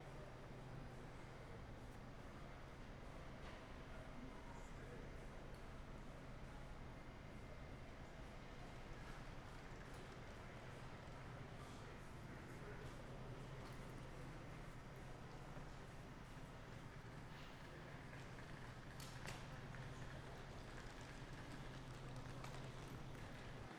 Soundscapes > Indoors
UnionStation TrainStationGateAmbience Quiet
Recording of Terminal Ambience taken at Union Station Train Terminal on 12-25-2023. recorded with Zoom H5-XY mic